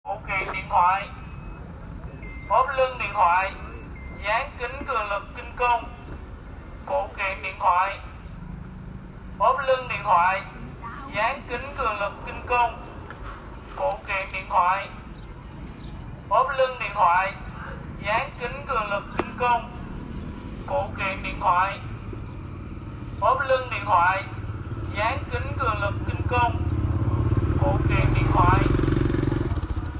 Speech > Solo speech
Phụ Kiện Điện Thoại, Ốp Lưng Điện Thoại, Dán Kính Cường Lực King Công
Man sell stuff for smart phone. Record use iPhone 7 Plus 2025.02.26 17:06
business, sell, smart-phone